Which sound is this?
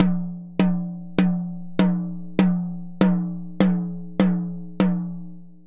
Music > Solo percussion
hi tom-oneshot sequence quick round robbin10 inch by 8 inch Sonor Force 3007 Maple Rack
tom, toms, acoustic